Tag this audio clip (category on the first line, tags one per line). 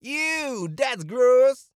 Speech > Solo speech
Cardioid
disgusted
FR-AV2
Voice-acting
In-vehicle
20s
Single-mic-mono
one-shot
mid-20s
RAW
oneshot
eww
Tascam
Male
VA
2025
August
A2WS
Dude
Mono
SM57
France
Surfer
English-language
Adult